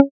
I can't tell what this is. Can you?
Instrument samples > Synths / Electronic
APLUCK 8 Db
additive-synthesis, fm-synthesis